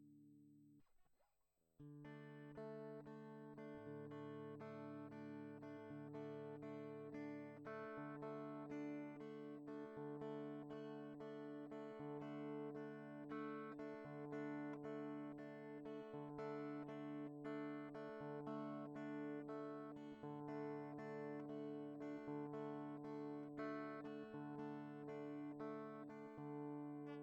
Music > Solo instrument
Little guitar riff